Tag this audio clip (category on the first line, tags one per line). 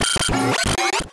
Sound effects > Electronic / Design
hard
pitched
stutter
one-shot